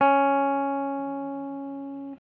Instrument samples > String
Random guitar notes 001 CIS4 08
electric, electricguitar, guitar, stratocaster